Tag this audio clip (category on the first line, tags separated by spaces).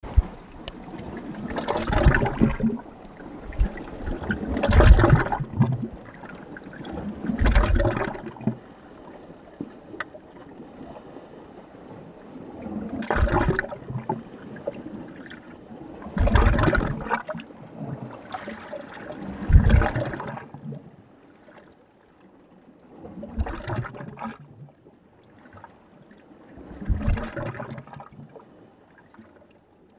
Soundscapes > Nature
ocean coast bubbly zoom trogir field-recording seaside croatia shore sea beach tidalwaves waves water rocks